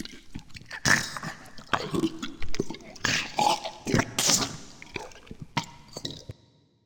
Sound effects > Experimental
Creature Monster Alien Vocal FX (part 2)-012
mouth, fx, Alien, otherworldly, devil, weird, Monster, bite